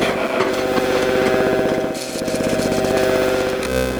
Sound effects > Electronic / Design
Industrial Estate 43
120bpm, chaos